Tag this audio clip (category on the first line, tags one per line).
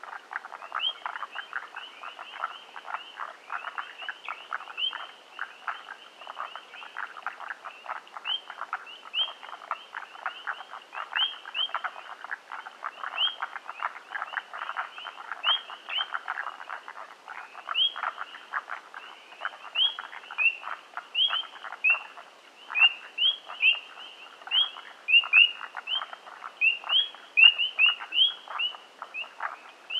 Soundscapes > Nature
croak
night
toads
whistle
nature
midnight
birds
frogs
pond
chirrup
chirps
marsh
swamp
calls
insects
wetlands